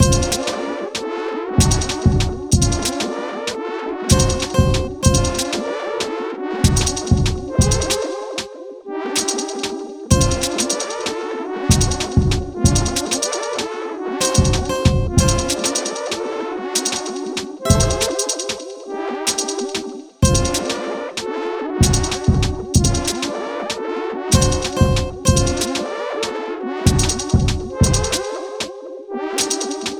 Music > Multiple instruments
is at 190 bpm, A# minor enjoy!